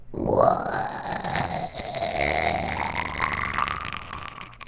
Sound effects > Animals
zombie growl 1
vocal zombie growl
goblin, groan, growl, monster, throat, zombie